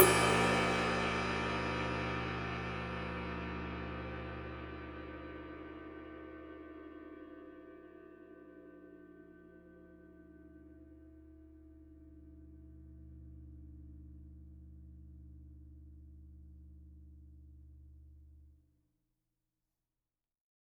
Music > Solo instrument
Paiste 22 Inch Custom Ride-010
Custom, Cymbals, Drum, Drums, Kit, Metal, Paiste